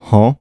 Speech > Solo speech
Subject : Mid 20s male, saying "Huh" in confusion. Date YMD : 2025 June 14 Location : Albi 81000 Tarn Occitanie France. Hardware : Tascam FR-AV2, Shure SM57 with A2WS windcover Weather : Processing : Trimmed in Audacity.
mid-20s, male